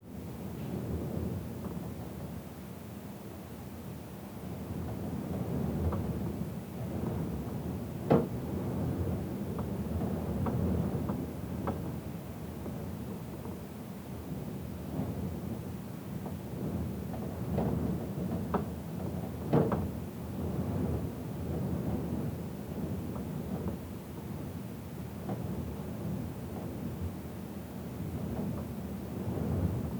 Soundscapes > Indoors
rome-tone with wind outside. gusts, shutters.